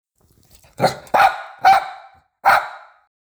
Sound effects > Animals
An over excited Shih Tzu Barking
Some more Shih Tzu Barks. This is from an excited doggy wanting to play then again who am i kidding so were the other ones i uploaded before lol! Made by R&B Sound Bites if you ever feel like crediting me ever for any of my sounds you use. Good to use for Indie game making or movie making. This will help me know what you like and what to work on. Get Creative!